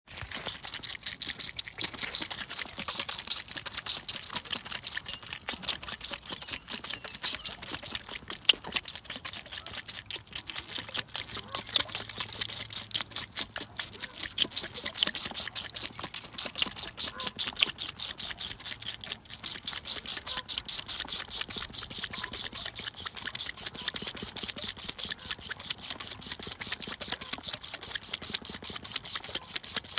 Soundscapes > Nature
Charlie Chaplin the sheep , sucking on a bottle feeding 03/12/2023
Charlie Chaplin the sheep , sucking on a bottle feeding
baby-sheep, bottle-feeding